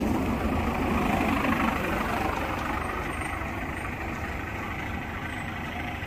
Sound effects > Vehicles
final bus 2
hervanta, finland, bus